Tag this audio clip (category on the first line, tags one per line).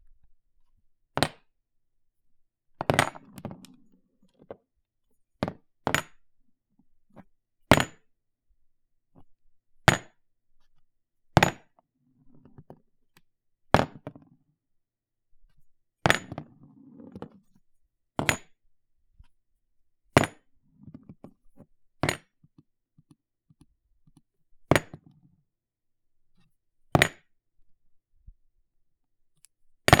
Sound effects > Objects / House appliances
bottle
clink
drop
glass
impact
thud